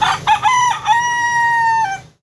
Sound effects > Animals
BIRDFowl-CU Rooster, Single Morning Crow Nicholas Judy TDC
A rooster crowing.
cock-a-doodle-doo, rooster, single, cock, morning, Phone-recording, wake-up, crow, dawn, cockerel